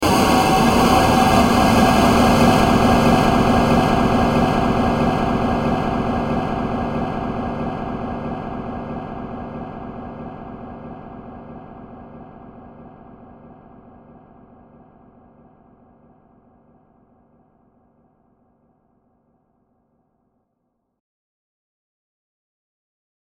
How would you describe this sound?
Sound effects > Other
the voice of hell
this is what you hear when you enter hell.
abyss, voice